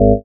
Instrument samples > Synths / Electronic
WHYBASS 4 Ab
bass, additive-synthesis